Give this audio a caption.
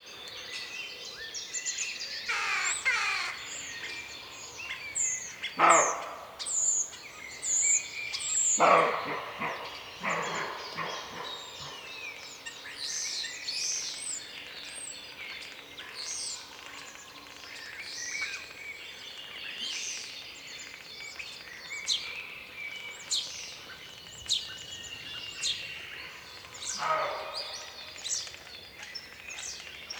Soundscapes > Nature
Forest atmosphere 012(localization Poland)
ambience, ambient, atmosphere, birdsong, calm, environmental, European-forest, field-recording, forest, natural, nature, outdoor, Poland, rural, soundscape, wild